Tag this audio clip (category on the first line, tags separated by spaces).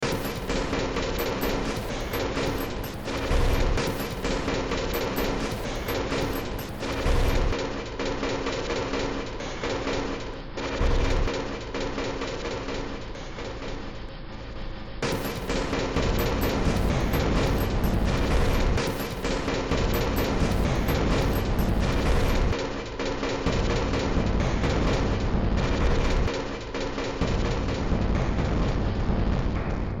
Music > Multiple instruments
Soundtrack Sci-fi Games Cyberpunk Underground Industrial Horror Ambient Noise